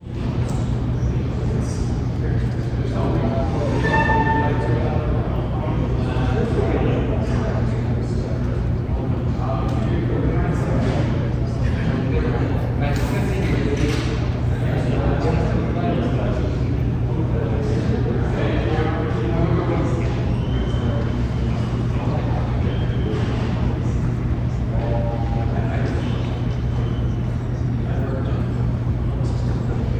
Soundscapes > Indoors
Recording of the ambiance in the Bob & Betty Beyster Building (BBB) on the University of Michigan campus. The recording was captured on the fourth floor of the building by holding the phone over the balcony.